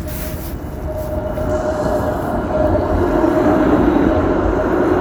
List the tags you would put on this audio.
Sound effects > Vehicles
transportation
vehicle
tramway

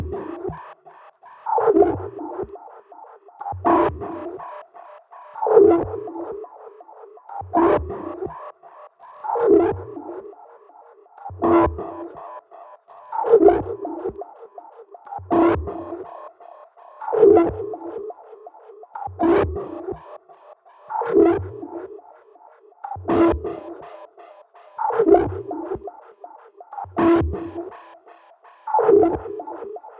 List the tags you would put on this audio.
Instrument samples > Synths / Electronic
electronic
glitch
loop
minimal
sound
sound-design

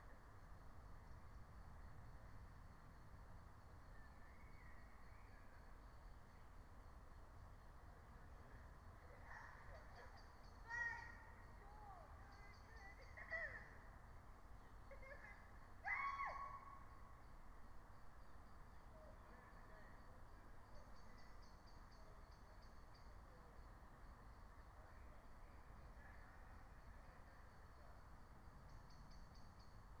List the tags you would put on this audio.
Soundscapes > Nature
field-recording
meadow
natural-soundscape
nature
phenological-recording
raspberry-pi
soundscape

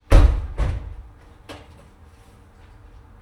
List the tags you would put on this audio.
Sound effects > Vehicles
far
slam
dji-mic3

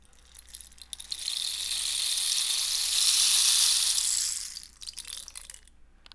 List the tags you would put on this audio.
Sound effects > Objects / House appliances
fx
drill
percussion
sfx
natural
oneshot

